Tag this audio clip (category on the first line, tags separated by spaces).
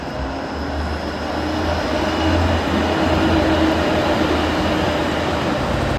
Soundscapes > Urban
tram vehicle